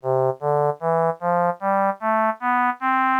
Instrument samples > Synths / Electronic
Tuba scale
Tuba test i made in furnace. (WARNING) THIS SOUND IS ELETRONIC.
Furnace, Scale, Tuba